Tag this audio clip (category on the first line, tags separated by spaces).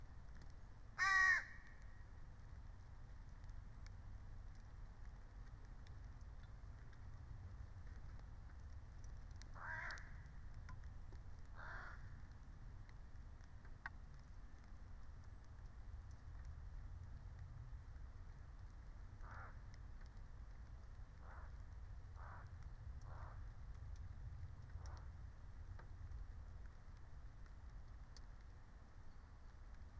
Soundscapes > Nature
soundscape phenological-recording